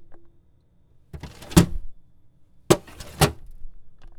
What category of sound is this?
Sound effects > Objects / House appliances